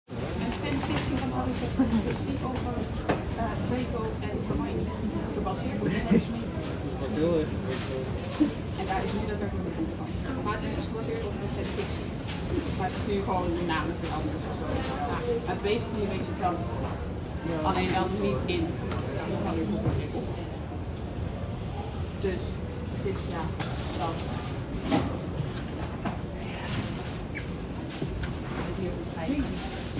Soundscapes > Indoors
iPhone 6 stereo recording of Dutch walla in a busy bookshop. Cash register can be heard.